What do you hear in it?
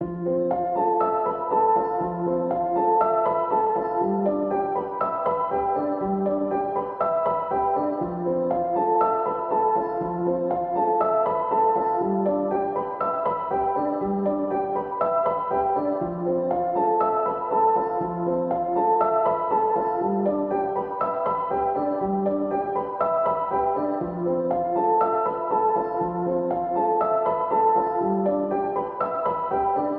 Music > Solo instrument
Piano loops 174 efect 4 octave long loop 120 bpm
pianomusic, piano, reverb, 120bpm, loop, simple, music, simplesamples, free, samples, 120